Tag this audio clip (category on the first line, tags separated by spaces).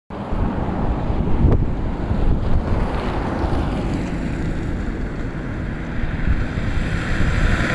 Sound effects > Vehicles
car traffic vehicle